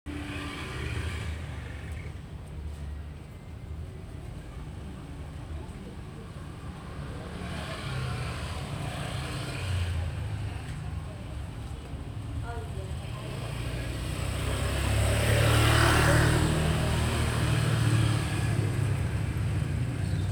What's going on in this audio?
Soundscapes > Urban
distant and passing motorcycles baskoro 01
Ambient recording of distant motorcycle traffic recorded from a side street nearby a main road in the Baskoro area of Tembalang, Semarang City. The sound of a motorcycle passing by me can be heard in the end at around #00:14.
distant-traffic, field-recording, motorcycles, street, traffic, urban